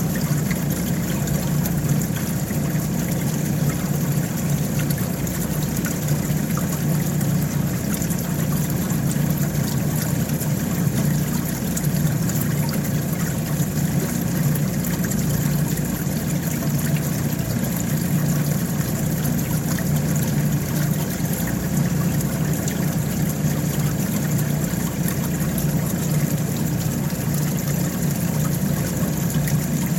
Soundscapes > Nature
WATRMisc-Samsung Galaxy Smartphone, CU Water, Flowing, Motor, Running Nicholas Judy TDC
Water flowing with motor running. Recorded at Green Top.